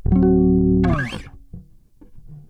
Music > Solo instrument
sus chord 8
bass, bassline, basslines, blues, chords, chuny, electric, electricbass, funk, fuzz, harmonic, harmonics, low, lowend, note, notes, pick, pluck, riff, riffs, rock, slap, slide, slides